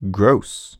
Speech > Solo speech
Displeasure - Gross 2
Voice-acting, Video-game, FR-AV2, voice, oneshot, Tascam